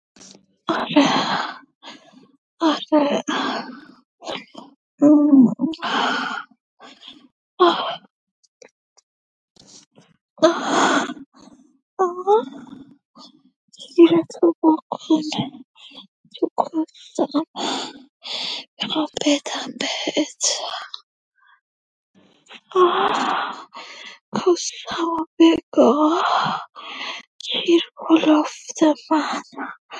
Speech > Solo speech
Sexy Persian female moaning while having sex